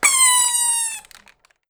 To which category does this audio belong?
Sound effects > Electronic / Design